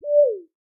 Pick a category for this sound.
Sound effects > Electronic / Design